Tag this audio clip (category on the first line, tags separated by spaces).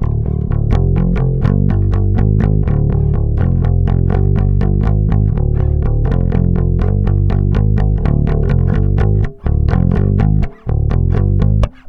Instrument samples > String
funk mellow fx pluck riffs loop rock oneshots charvel loops bass slide blues plucked electric